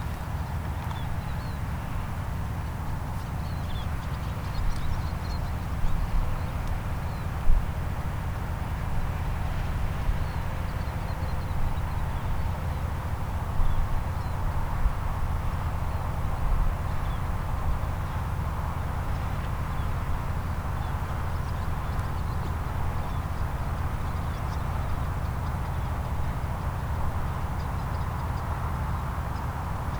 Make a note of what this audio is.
Soundscapes > Nature
Boardwalk leading down to secluded public beach, early morning, seagulls, birds, wind, distant traffic